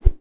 Sound effects > Natural elements and explosions
Stick - Whoosh 16 (sharp punch)
Subject : A whoosh sound made by swinging a stick. Recorded with the mic facing up, and swinging above it. Date YMD : 2025 04 21 Location : Gergueil France. Hardware : Tascam FR-AV2, Rode NT5. Weather : Processing : Trimmed and Normalized in Audacity. Fade in/out.
stick, whoosh, tascam, fast, SFX, whosh, FR-AV2, Swing, punch, Woosh, Transition, one-shot, swinging, oneshot, Rode, NT5